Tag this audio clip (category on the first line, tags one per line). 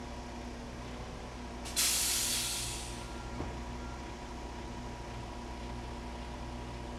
Sound effects > Vehicles
site
cement
concrete
hiss
construction
vehicle
cement-mixer
air
construction-site
air-brake